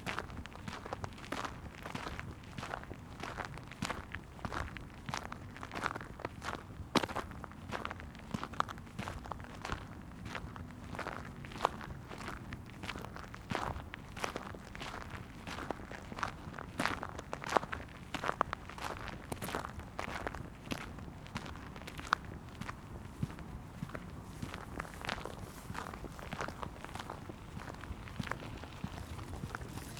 Sound effects > Human sounds and actions
Walking on gravel. Recorded with a Zoom H1essential